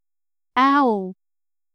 Sound effects > Human sounds and actions
a hurt sound i made. you can use it for your games or whatever.
games, hurt, sound